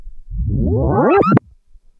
Sound effects > Electronic / Design
A short electronic modulation created using Audacity.